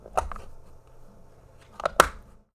Sound effects > Objects / House appliances
FOLYProp-Blue Snowball Microphone Eyeglass Case, Open, Close Nicholas Judy TDC
An eyeglass case opening and closing.
eyeglass, Blue-Snowball, case, Blue-brand, foley, open, close